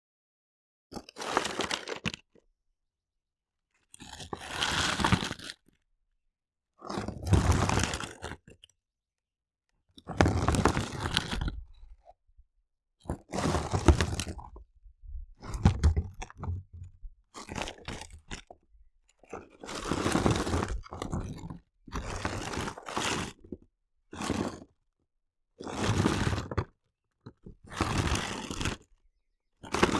Sound effects > Natural elements and explosions
sounds of rock movements from a plant tray part 2.
concrete, rocks, boulder, demolition, gravel, stone, elemental, movement, destruction, stones, dirt, debris, falling, avalanche, fall, pebbles, earth, rock, rubble, scraping